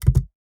Sound effects > Objects / House appliances
Laptopkeyboard Type 4 Click
Pressing the space bar on a laptop keyboard, recorded with an AKG C414 XLII microphone.
button, keyboard, office, space-bar, laptop